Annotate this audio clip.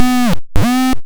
Sound effects > Electronic / Design
Optical Theremin 6 Osc dry-033
Alien, Digital, DIY, Electro, Glitch, Glitchy, Noise, noisey, Optical, Robotic, Scifi, Spacey, Sweep, Theremin, Trippy